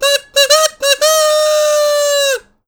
Sound effects > Animals
TOONAnml-Blue Snowball Microphone, CU Kazoo, Imitation, Rooster Crow Nicholas Judy TDC
A kazoo imitating a rooster crowing.
cockerel, crow, imitation, rooster